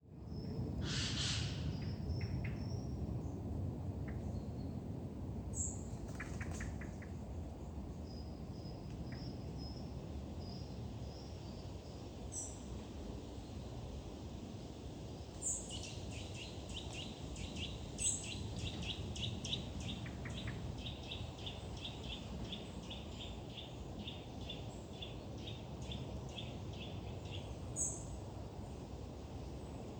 Soundscapes > Nature
iPhone 16 stereo recording of quiet woods with some birds, wind through trees/foliage.